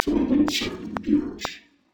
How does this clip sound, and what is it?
Speech > Processed / Synthetic
"throw a little sand in the gears" as a deep robot voice